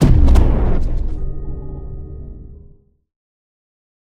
Sound effects > Other
Sound Design Elements Impact SFX PS 053
force, blunt, rumble, audio, shockwave, design, sharp, strike, power, smash, collision, crash, explosion, cinematic, sfx